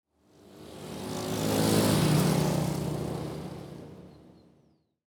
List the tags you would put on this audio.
Sound effects > Vehicles
moto car engine passby passing exhaust traffic old motorbike by

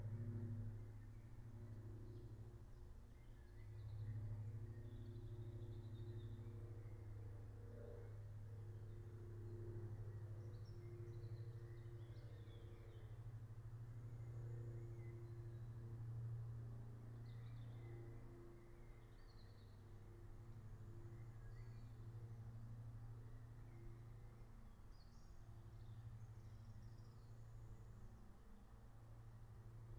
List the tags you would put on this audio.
Soundscapes > Nature
alice-holt-forest natural-soundscape nature meadow soundscape phenological-recording raspberry-pi field-recording

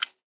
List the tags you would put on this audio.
Sound effects > Human sounds and actions
activation button click interface off switch toggle

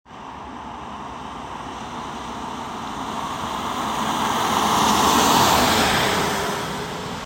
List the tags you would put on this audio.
Sound effects > Vehicles
car field-recording tampere

